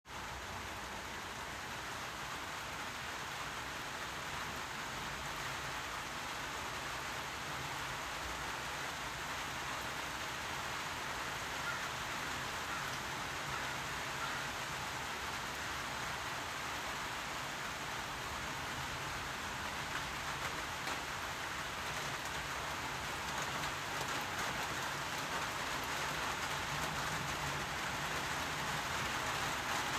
Soundscapes > Nature
Gentle spring rain may 05/02/2023
Gentle spring rain
country, field-recording, nature, rain, rural, spring